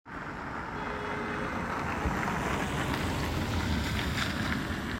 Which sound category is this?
Sound effects > Vehicles